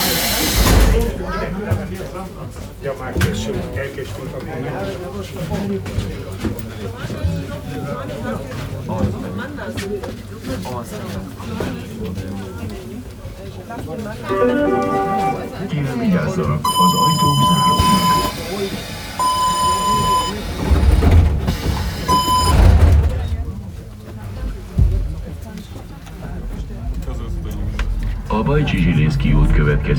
Soundscapes > Urban
Budapest metro M1 historic 1 interier
Historical subway train in Budapest